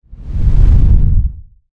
Sound effects > Electronic / Design
whoosh bass 1
Each file was more low-pitched than the previous but all had the same duration/length. Finally I used mildly WaveLab 11's restore effect on the mixdown.